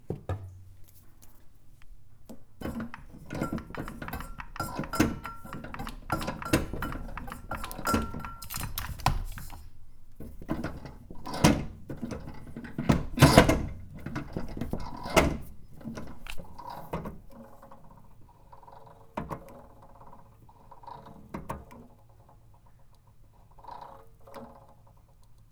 Sound effects > Other mechanisms, engines, machines
random wood shop foley misc
boom, percussion, sfx, sound, tools, knock, perc, bam, thud, wood, fx, bang, crackle, tink, strike, bop, rustle, foley, little, pop, shop, metal, oneshot